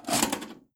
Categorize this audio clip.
Sound effects > Objects / House appliances